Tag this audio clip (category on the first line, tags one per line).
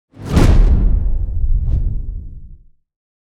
Sound effects > Other
audio
blunt
cinematic
collision
effects
force
game
hard
heavy
impact
power
sharp
smash
sound